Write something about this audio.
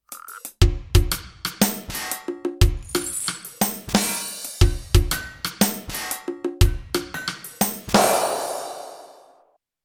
Music > Solo percussion
percussive excerpt for punctuating theatre or video scenes. Used Alesis SR 16 drum machine, a guiro and assorted clangs.
theatre, film, or